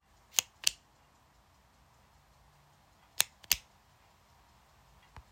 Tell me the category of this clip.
Sound effects > Objects / House appliances